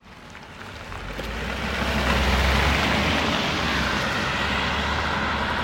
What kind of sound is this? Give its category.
Soundscapes > Urban